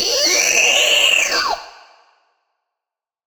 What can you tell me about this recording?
Sound effects > Experimental
Creature Monster Alien Vocal FX (part 2)-049
gross, grotesque, fx, howl, Monster, otherworldly, demon, zombie, Sfx, snarl, devil, growl, Alien, bite, Creature, mouth